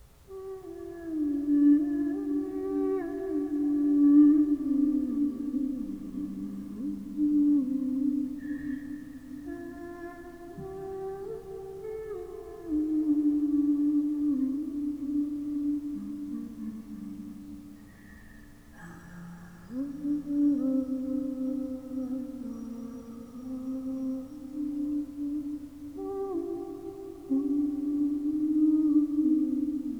Music > Solo instrument
woman humming cathedral
An edited version of a humming track I recorded in my room, with added reverb using OrilRiver to sound like a cathedral